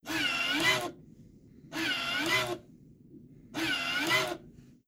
Sound effects > Other mechanisms, engines, machines
MOTRSrvo-Samsung Galaxy Smartphone, MCU Purely Auto Hand Sanitizer Nicholas Judy TDC
A Purell auto hand sanitizer motor.
auto, Phone-recording, purell, sanitizer